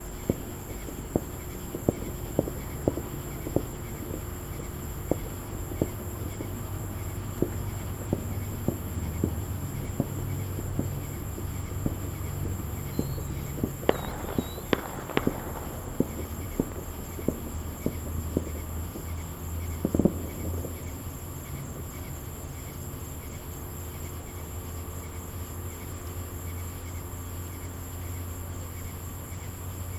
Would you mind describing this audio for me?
Soundscapes > Urban
AMBSea-Summer Dock at Mo's Landing on Little Lagoon Distant Fireworks, Crickets, Boats 10PM QCF Gulf Shores Alabama
The boat dock at Moe's Landing, 10PM on July 3rd, fireworks and watercraft in the distance. Crickets, frogs, distant passing traffic.